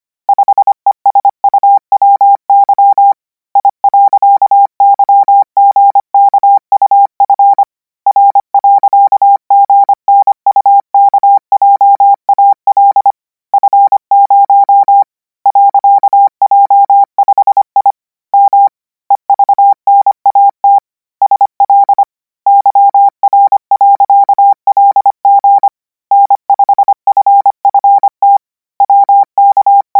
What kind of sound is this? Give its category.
Sound effects > Electronic / Design